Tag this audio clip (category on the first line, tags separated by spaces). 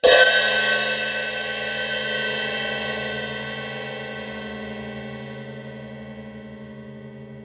Instrument samples > Percussion
garbage sticks percussive groovy solo hit percussion percussion-loop loop drums improvised hihats drum snare drum-loop hh samples percs acoustic